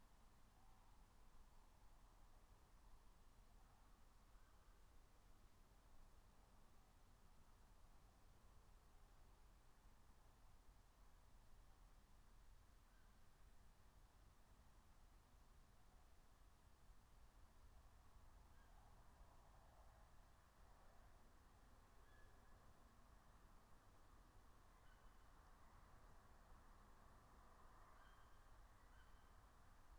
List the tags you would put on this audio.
Soundscapes > Nature
alice-holt-forest field-recording natural-soundscape nature phenological-recording raspberry-pi soundscape

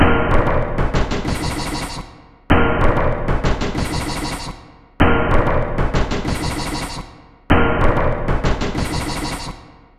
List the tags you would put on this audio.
Instrument samples > Percussion
Samples Dark Alien Ambient Drum Soundtrack Industrial Packs Loopable Weird Underground Loop

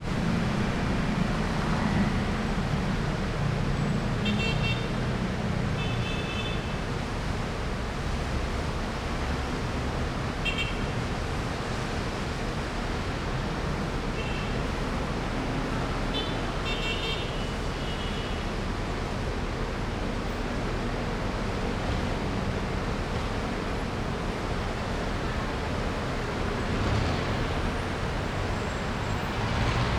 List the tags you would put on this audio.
Soundscapes > Urban
ambience atmosphere car cars city field-recording honking horn jeepney jeepneys Manila motorcycle motorcycles noisy Philippines road soundscape street town traffic traffic-jam train truck trucks urban vehicles

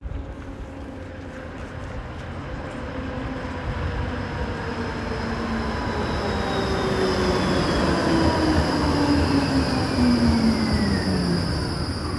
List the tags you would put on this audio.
Soundscapes > Urban
tram transport

